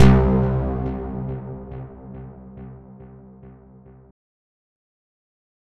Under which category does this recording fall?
Instrument samples > Synths / Electronic